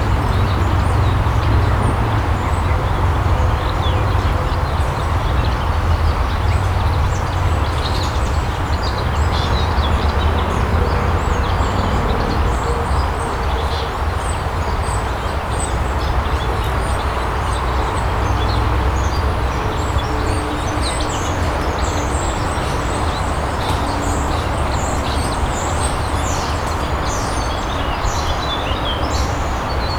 Soundscapes > Nature
Le Soler Lac south side XY - 2025 04 11 12h15
Added 40db in post! Zoom H2n XY mode, probably set around 4 gain ish? Recorded at "le soler" in the south of france the 2025 04 11 near 12h15 Also a contribution to Dare2025-05, for recording a bird sound.
ambience, birds, Dare2025-05, france, H2N, lesoler, soundscape, XY, zoom